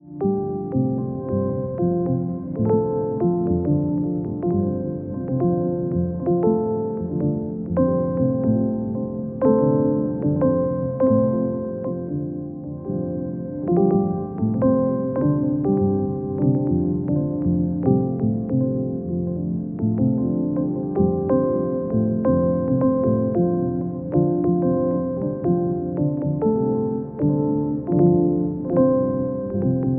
Soundscapes > Synthetic / Artificial
Botanica-Granular Ambient 12
Granular, Ambient, Botanica, Beautiful, Atomosphere